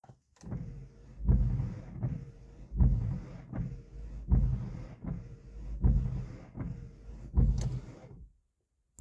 Sound effects > Vehicles
Windscreen wipers heard from inside a car in dry conditions; Repeated mechanical clunking, wipers sliding against glass. Recorded on the Samsung Galaxy Z Flip 3. Minor noise reduction has been applied in Audacity. The car used is a 2006 Mazda 6A.